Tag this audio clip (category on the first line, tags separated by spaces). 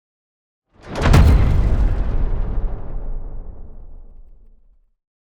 Sound effects > Other
force hit audio strike heavy percussive cinematic rumble game explosion sound effects crash blunt hard transient sfx collision shockwave impact thudbang power smash design sharp